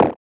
Instrument samples > Synths / Electronic
A growly, short one-shot made in Surge XT, using FM synthesis.